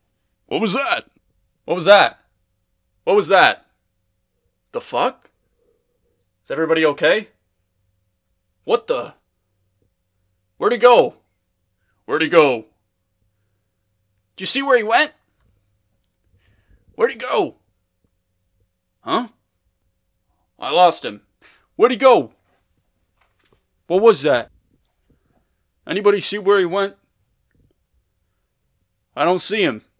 Speech > Solo speech
Henchman #2 Voice Lines
enemy, fighting, combat, goon, punch, gasp, thug, Henchman